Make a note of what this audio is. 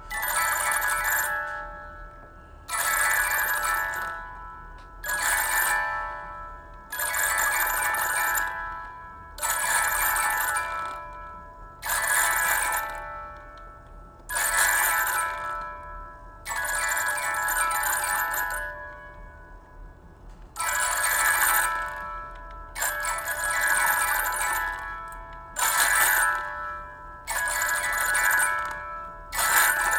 Sound effects > Objects / House appliances
TOONMisc-Blue Snowball Microphone, CU Spinning, Music Box Notes Nicholas Judy TDC
Cartoon spinning sounds with music box notes.